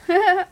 Speech > Other
Kỳ Duyên Cười 1 - Laugh 1 - Risa 1
Ky Duyên laugh. Record use iPad 2 2025.07.26 09:52
funny, female, laugh, woman